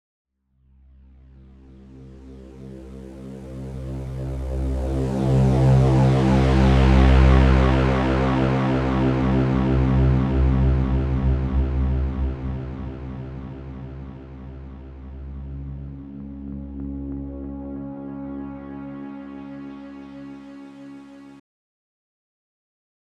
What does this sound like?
Instrument samples > Synths / Electronic
Deep Pads and Ambient Tones14
Digital, Tones, synthetic, Chill, Ominous, bass, Synthesizer, Haunting, Synth, Deep, Pad, Pads, Ambient, bassy, Note, Tone, Analog, Oneshot, Dark